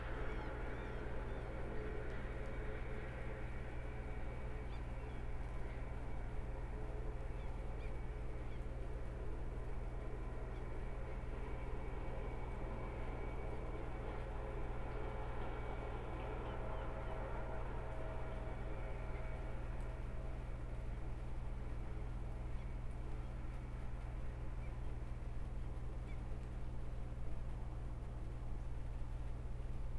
Soundscapes > Urban
AMBCnst Calm Sunday at the Verkö industrial area with light rain, Karlskrona, Sweden
Recorded 14:05 21/04/25 On this rainy Sunday there’s very few people around, rarely any cars. In the beginning a construction elevator descends. Afterwards there’s little activity in the background; Some seagulls, the outflow of a ventilation duct, and other quiet activity. The recording is made at a desolate bus station and thus the sound of rain falling on the plastic roof is heard. Zoom H5 recorder, track length cut otherwise unedited.
Daytime, Elevator, Light, Rainy, Duct, Industry, Ambience, Field-Recording, Rain, Ventilation, Sunday, Seagulls, Station, Sweden, Verk, Karlskrona, Dripping